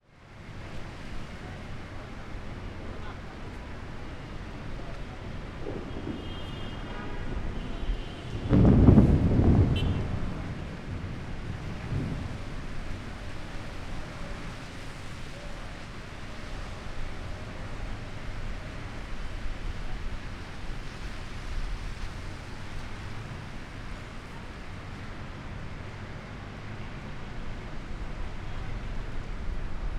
Soundscapes > Urban
Traffic and loud thunderclap in Manila. I made this recording from the side of the Mall of Asia, located in Manila, Philippines. One can hear traffic in a wide wet street, and a loud thunderclap on the left at #0:05. Recorded in August 2025 with a Zoom H5studio (built-in XY microphones). Fade in/out applied in Audacity.